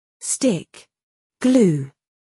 Speech > Solo speech
stick, glue
english, pronunciation, voice, word